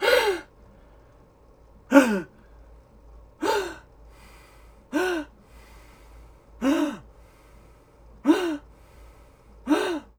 Sound effects > Human sounds and actions
Various short gasps.